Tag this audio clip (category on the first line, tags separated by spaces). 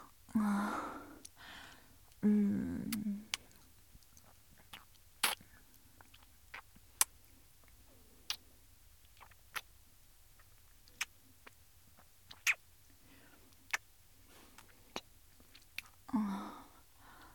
Sound effects > Human sounds and actions
Erotic Popsicle